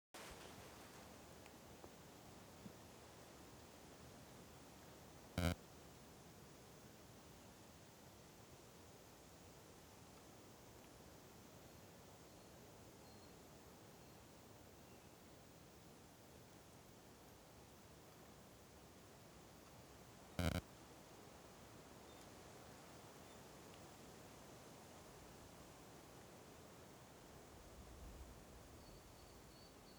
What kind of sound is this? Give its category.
Soundscapes > Nature